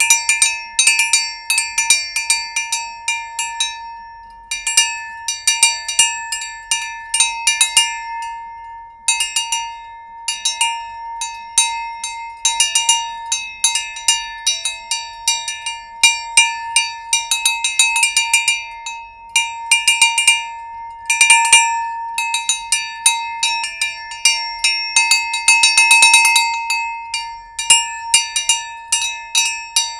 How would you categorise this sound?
Music > Solo percussion